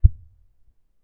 Sound effects > Objects / House appliances
Electrical Discharge of the Capacitor of the Amplifier when Turned Off
This is the sound of the big capacitor of the Polytone amplifier discharging when the amp is turned off. Recorded with Tascam Portacapture X6
amplifier, capacitor, discharge, electrical, electromagnetic, guitar